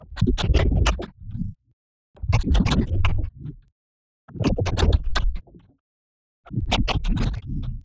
Sound effects > Electronic / Design
FX-Liquid Woosh FX 1
Botanica, Element, FX, Liquid, Water, Woosh